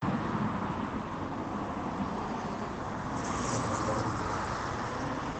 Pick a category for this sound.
Sound effects > Vehicles